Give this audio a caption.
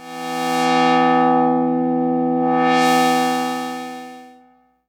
Sound effects > Experimental
Analog Bass, Sweeps, and FX-117
effect, retro, bassy, dark, weird, sci-fi, trippy, fx, sweep, oneshot, basses, mechanical, alien, vintage, bass, complex, pad, electro, electronic, scifi, sfx, synth, analogue, snythesizer, korg, robotic, machine, analog, sample, robot